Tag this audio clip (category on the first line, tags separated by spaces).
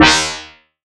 Instrument samples > Synths / Electronic
fm-synthesis additive-synthesis bass